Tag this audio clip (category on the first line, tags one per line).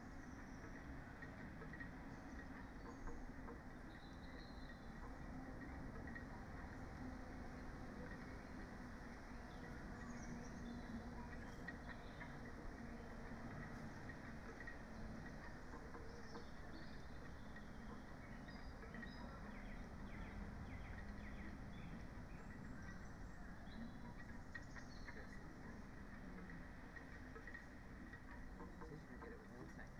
Soundscapes > Nature
phenological-recording
weather-data
sound-installation
nature
field-recording
data-to-sound
raspberry-pi
alice-holt-forest
Dendrophone
natural-soundscape
soundscape
modified-soundscape
artistic-intervention